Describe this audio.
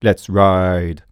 Speech > Solo speech
Lets ride
un-edited, chant, FR-AV2, lets, Mid-20s, Man, singletake, raw, dry, oneshot, Vocal, Single-take, voice, ride, U67, Tascam, Male, Neumann, hype